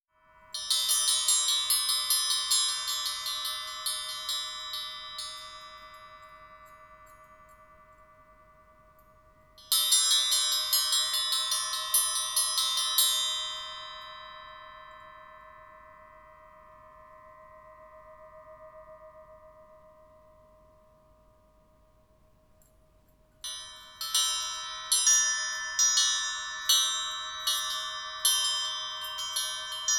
Sound effects > Other
handbell with multiple tongues, gives off a delicate multe-layered sound
bell, chime, tingle, ring